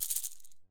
Instrument samples > Percussion
Dual shaker-020
recording, sampling